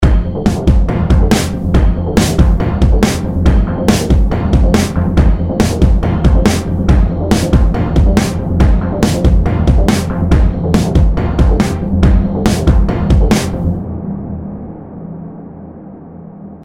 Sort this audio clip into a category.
Music > Multiple instruments